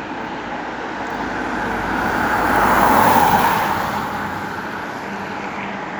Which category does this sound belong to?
Soundscapes > Urban